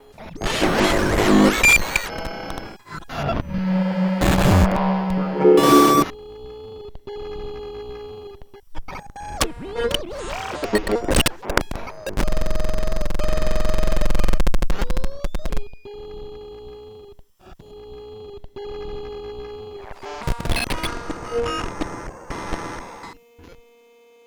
Sound effects > Experimental
This pack focuses on sound samples with synthesis-produced contents that seem to feature "human" voices in the noise. These sounds were arrived at "accidentally" (without any premeditated effort to emulate the human voice). This excerpt is based on using the output from touchplate controls (Ieaskul F. Mobenthey's "Mr. Grassi"), run through a module from G-Storm Electro which emulates the "weird" formant filter on the legendary Dutch Synton Syrinx.